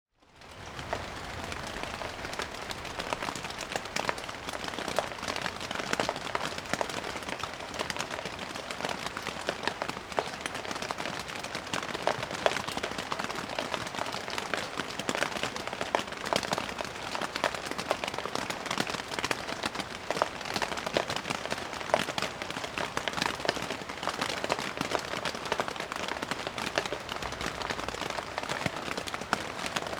Soundscapes > Other
Rain under Roof Plastic Sheet ZoomF3 RodeNTG5 32bitFloat 250423 003 Tr2
Rain drops on a plastic foil. Recorded in the city. Zoom F3 Rode NTG5
plastic, weather